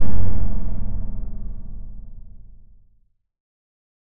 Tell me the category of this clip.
Sound effects > Electronic / Design